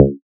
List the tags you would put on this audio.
Instrument samples > Synths / Electronic
bass
additive-synthesis
fm-synthesis

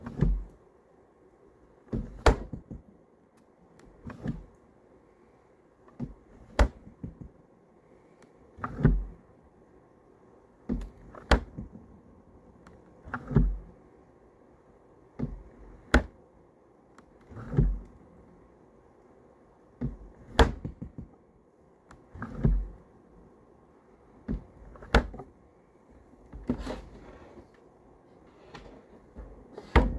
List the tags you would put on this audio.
Sound effects > Objects / House appliances
fridge
Phone-recording
foley
close
1950s
refrigerator
retro
open

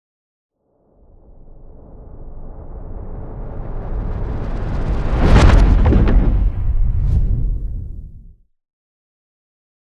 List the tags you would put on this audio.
Sound effects > Other
bass
boom
cinematic
deep
epic
explosion
game
hit
impact
implosion
industrial
movement
riser
stinger
sub
sweep
tension
thud
trailer
transition
whoosh

